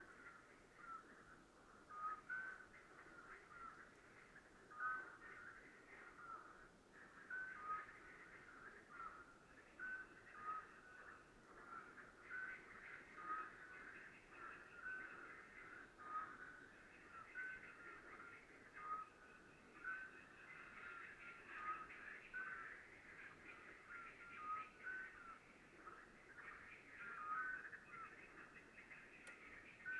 Soundscapes > Nature
Countryside by night - Greece
Countryside by night recorded in Greece. Recorder: Zoom H5 (Xy tecnique) + Tascam TM 200 SG